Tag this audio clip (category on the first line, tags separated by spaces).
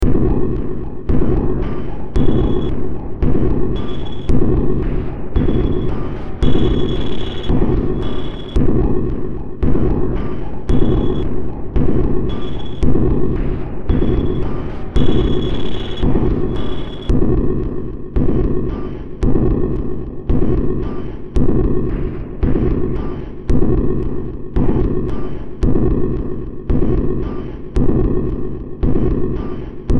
Music > Multiple instruments
Noise Horror Cyberpunk Industrial Underground Soundtrack Sci-fi Games Ambient